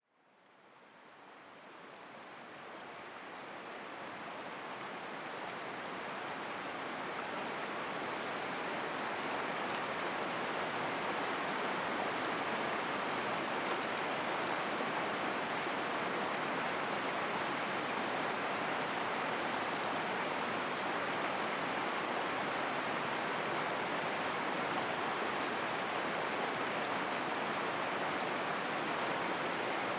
Soundscapes > Nature
Glacial river by the Ujuntor Glacier toe
09/08/25 - Ujuntor Glacier toe, Karakol Valley, Kyrgyzstan Evening recording I made by the Ujuntor Glacier toe in Kyrgyzstan. Tried to capture internal sounds of the glacier itself, but the river flowing out if it overpowererd the recording. Zoom H2N